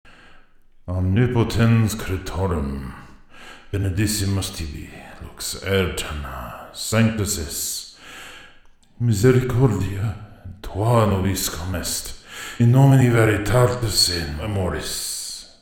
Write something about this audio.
Speech > Solo speech
Creepy Latin Speech 3 Prayer or Summoning Deep Voice
Deep voice saying random Latin words, praying, summoning, spell, chanting. Made for a story.
creepy, drama, eerie, ghost, Gothic, haunted, latin, prayer, praying, preist, scary, sinister, spookie, spooky, summoning, thrill